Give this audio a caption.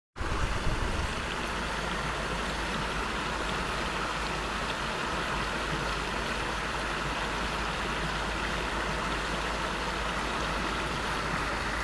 Soundscapes > Nature
Running Stream
A stream running off of The River Tone in Taunton, Somerset, UK. Recorded on 17th July 2024 using a Google Pixel 6a phone. I want to share them with you here.
stream, flowing, river, running, brook, creek, water